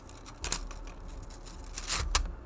Sound effects > Objects / House appliances
TOYMisc-Blue Snowball Microphone, CU Slinky, Slink Nicholas Judy TDC
A slinky slinking.
Blue-brand, Blue-Snowball, foley, slink, slinky